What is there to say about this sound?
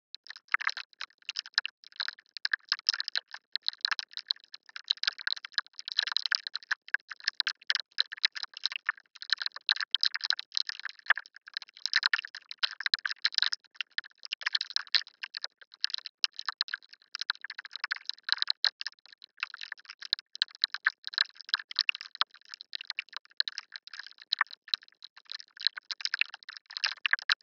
Sound effects > Electronic / Design
ROS-Saliva Texture1-Processed
All samples used from: TOUCH-LOOPS-VINTAGE-DRUM-KIT-BANDLAB. Processed with KHS Filter Table, KHS Convolver, Vocodex, ZL EQ and Fruity Limiter.
Botanica, Effect, FX, Organic, Texture